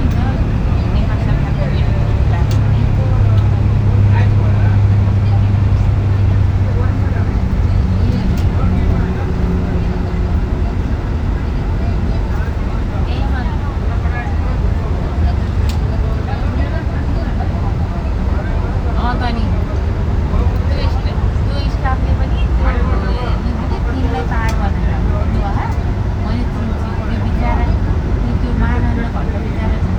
Soundscapes > Urban
AMB-BusInterior,Engine,Walla-Pokhara,Nepal-16Jul2025-0944H
Interior sound of a long distance bus on a highway road in Nepal. Recorded with iPhone 14 Pro internal microphone.